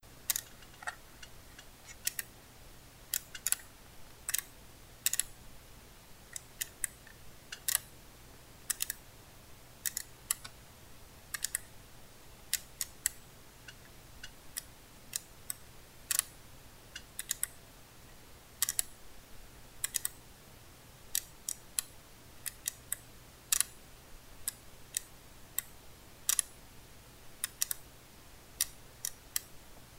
Sound effects > Objects / House appliances
A hand turbine radio dial turn ratcheting.